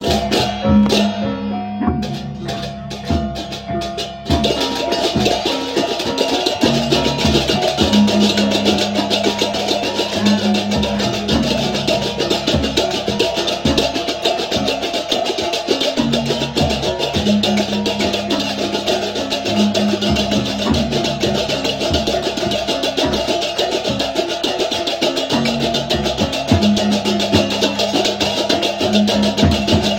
Soundscapes > Urban
2025 Balinese Musical Instruments - Gunung Kawi Tampak Siring Temple
On my visit to Gunung Kawi Tampaksiring, Bali. I was lucky to hear traditional Balinese musical instruments being played in the temple courtyards. It was a special day with a major celebration, feast and music. Device: iPhone 13 Pro Max
Ambience, Asian, audio, Bali, Balinese, celebration, ceremonial, ceremony, courtyard, cultural, culture, gamelan, Gunung, heritage, Indonesian, instruments, iPhone, Kawi, music, performance, ritual, sacred, soundscape, Southeast, spiritual, Tampaksiring, temple, traditional, travel